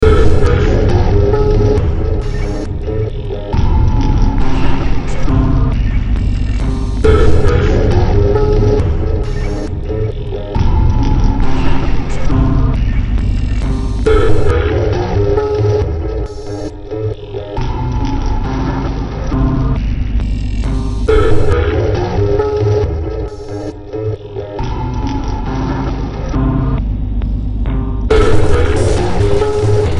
Music > Multiple instruments
Demo Track #3041 (Industraumatic)
Ambient, Cyberpunk, Games, Horror, Industrial, Noise, Sci-fi, Soundtrack, Underground